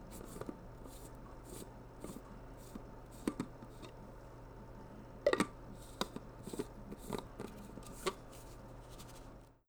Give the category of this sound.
Sound effects > Objects / House appliances